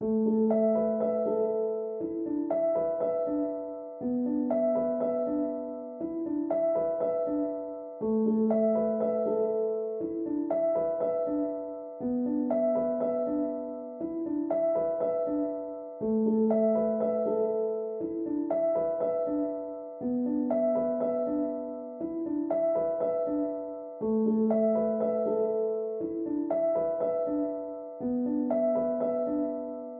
Music > Solo instrument
Piano loops 189 octave down long loop 120 bpm
120, 120bpm, free, loop, music, piano, pianomusic, reverb, samples, simple, simplesamples